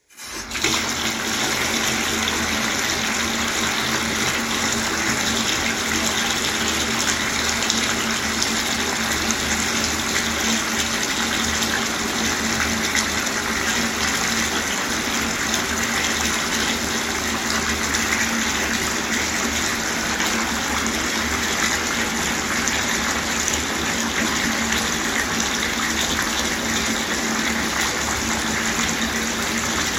Sound effects > Objects / House appliances
WATRPlmb Samsung Galaxy Smartphone, CU Bathtub Turn On, Filling, Turn Off Nicholas Judy TDC
A bathtub turning on, filling up and turning off.
bathtub, fill, fill-up